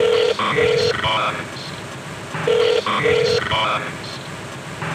Sound effects > Experimental
Broken Radio Loop
An SFX piece I made for a video game years ago. Perfect for something playing in the background! And it loops perfectly! It was made to be loopable, and left playing forever. Very post-apocalyptic. Samples a non-stop dial tone, featuring my voice saying 'sunny skies', glitched out.